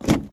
Sound effects > Vehicles
VEHDoor-Samsung Galaxy Smartphone Car Door, Open Nicholas Judy TDC
A car door opening.
open, foley, Phone-recording, car, door